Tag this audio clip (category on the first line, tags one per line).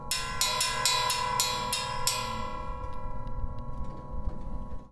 Sound effects > Objects / House appliances
Metal
Bang
trash
scrape
Robotic
garbage
Dump
Perc
Robot
dumping
Ambience
rattle
tube
waste
Clank
Clang
Foley
Atmosphere
Junkyard
Environment
SFX
Bash
dumpster
Machine
rubbish
Percussion
FX
Junk
Smash
Metallic